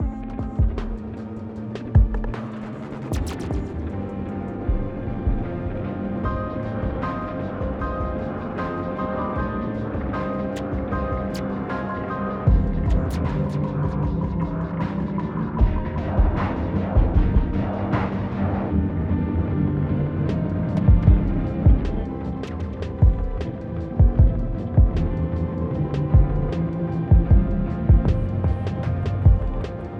Music > Multiple instruments
Con Título (2024)
Instrumental by AMK5 Made entirely on an old iMac 🖥 Using GarageBand. The instruments I used were: - Nashville Electric Guitar - Freedom Guitar Amp - U-Phoria UMC 22 interface Other loops and virtual instruments I used, all from Garage Band: – Higher Power Beat – Jamaican Jaeger Shaker – Big Maracas 08 – Just Bounce Beat – African Rain Caxixi – Knuckle Down Beat – Hall of Fame Synth – Vox Box Lead – Pulsating Waves ––––––– Beatmaker based in Quito - Ecuador. At the start it is a calm soothing relaxing melody with guitar until the sub bass makes an entrance. Then the beat kicks in and the instrumental turns into a more rapid track, kind of trap , hip hop, rap vibes. The track evolves through different BPMs.
bass, beat, composition, electronic, guitar, instrumental, upbeat, uptempo